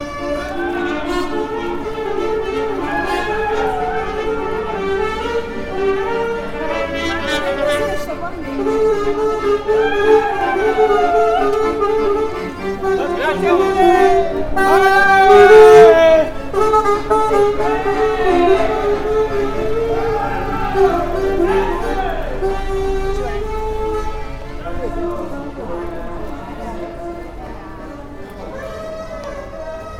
Soundscapes > Urban
Recorded in Florence using TASCAM DR-05XP